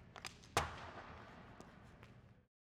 Sound effects > Other
Ice Hockey Sound Library Chip off Glass
Chipping the puck off the glass.
Action, Ice-Hockey, Sports